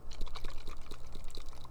Sound effects > Objects / House appliances
Shaking a magic 8-ball.